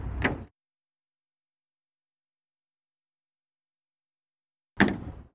Sound effects > Objects / House appliances
The little library door opening and closing. Recorded at The Local Cup.